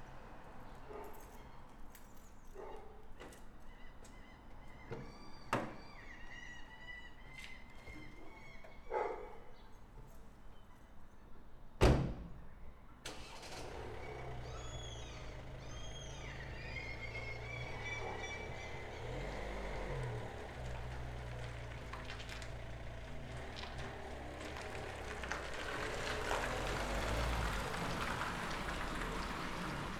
Soundscapes > Urban
AMBTown Traffic, seagulls, and street ambience heard from inside an open garage, Karlskrona, Sweden
Recorded 11:07 16/04/25 Inside a garage with its door open. The garage is in town and is facing a street. In the beginning a van drives away and later another van parks in the same spot. A few pedestrians and cars pass the street too. There’s also some noisy seagulls and a sparrows nearby. Zoom H5 recorder, track length cut otherwise unedited.